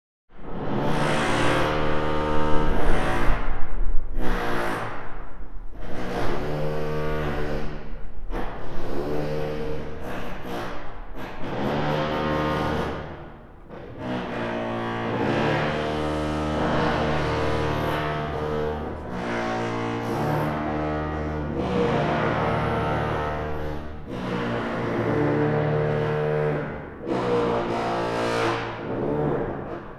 Sound effects > Other mechanisms, engines, machines
Hammer drills
The sounds of renovation in a large hangar Tascam DR100 Mk3 and Built-in UNI microphones I wasn't prepared for the recording and didn't have any windshields for the microphones, which is why there are some slight gusts of wind. I hadn't planned on recording, and I just happened to have the recorder with me.